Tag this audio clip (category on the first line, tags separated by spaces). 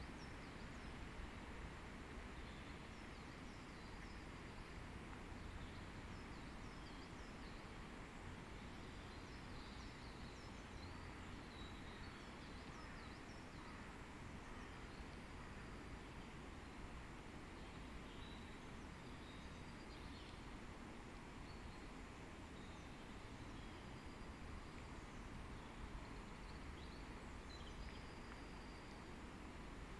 Soundscapes > Nature
nature natural-soundscape artistic-intervention alice-holt-forest soundscape field-recording sound-installation weather-data phenological-recording Dendrophone raspberry-pi modified-soundscape data-to-sound